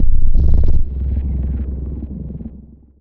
Instrument samples > Synths / Electronic
CVLT BASS 16
subwoofer, lowend, subs, sub, wavetable, bassdrop, stabs, low, synth, wobble, drops, clear, synthbass, subbass, bass, lfo